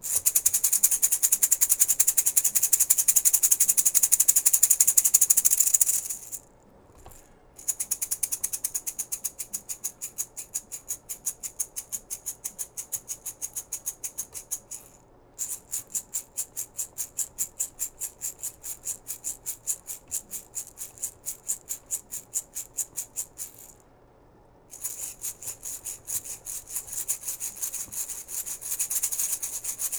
Music > Solo percussion
MUSCShake-Blue Snowball Microphone, CU Egg Shakers, Shaking Nicholas Judy TDC
Egg shakers shaking.